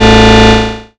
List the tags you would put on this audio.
Instrument samples > Synths / Electronic
fm-synthesis bass additive-synthesis

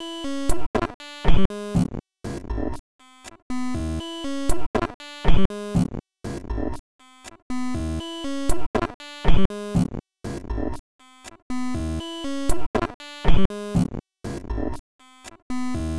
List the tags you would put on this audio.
Sound effects > Experimental
Alien Ambient Dark Industrial Loopable Packs Samples Soundtrack Underground Weird

